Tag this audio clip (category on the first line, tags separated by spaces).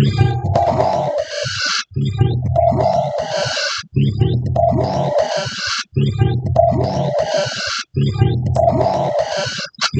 Sound effects > Electronic / Design
content-creator dark-design dark-soundscapes dark-techno drowning glitchy-rhythm industrial-rhythm noise noise-ambient PPG-Wave rhythm science-fiction sci-fi scifi sound-design vst weird-rhythm wonky